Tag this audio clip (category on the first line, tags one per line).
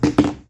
Sound effects > Objects / House appliances
foley
piece
down
Phone-recording
knock
chess